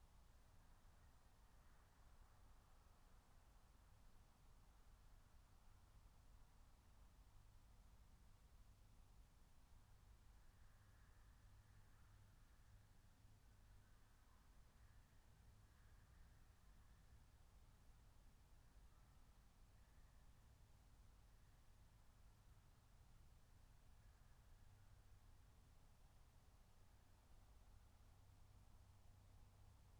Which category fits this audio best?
Soundscapes > Nature